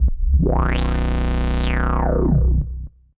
Sound effects > Experimental
Analog Bass, Sweeps, and FX-138
oneshot, sample, scifi, bassy, pad, alien, snythesizer, fx, electronic, robotic, weird, robot, trippy, effect, sfx, retro, sci-fi, synth, analogue, basses, dark, mechanical, electro, complex, analog, bass, korg, sweep, vintage, machine